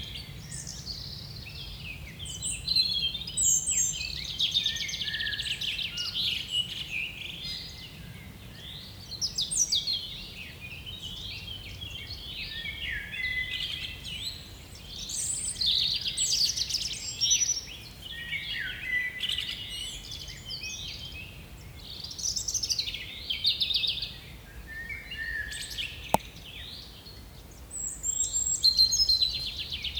Soundscapes > Nature
20250418 15h00-16h44 - Gergueil forest chemin de boeuf
Subject : One of a few recordings from 10h37 on Friday 2025 04 18, to 03h00 the Saturday. Date YMD : 2025 04 18 Location : Gergueil France. "Chemin de boeuf". GPS = 47.23807497866109, 4.801344050359528 ish. Hardware : Zoom H2n MS mode (decoded in post) Added wind-cover. Weather : Half cloudy, little to no wind until late evening where a small breeze picked up. Processing : Trimmed and Normalized in Audacity.
Gergueil, nature, forret, field-recording, spring, ambience, birds, Forest, windless, 2025, Zoom-H2N, country-side, Bourgogne-Franche-Comte, H2N, ambiance, Rural, April, Mid-side, 21410, Cote-dor, France, MS